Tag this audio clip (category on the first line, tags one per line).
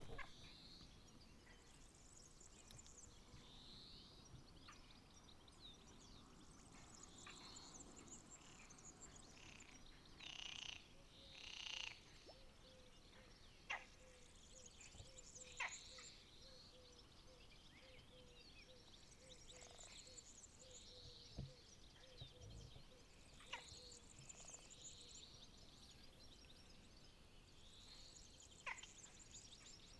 Sound effects > Animals
BIRDSONG
FROGS
LAKE
NATURE
WILDLIFE